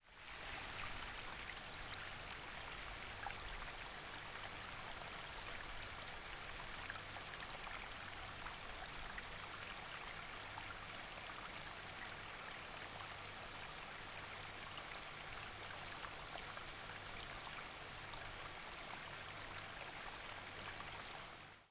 Soundscapes > Nature
Gentle creek, Therma, Ikaria, Greece
Gentle creek, flowing in Therma, Ikaria, Greece.
creek, relaxing, water, Gentle, stream, liquid, flowing, babbling, brook